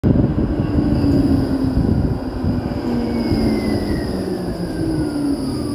Sound effects > Vehicles
11tram tostophervanta
A tram is slowing down to a stop. Recorded on a Samsung phone in Hervanta, Finland
travel, public-transport, tram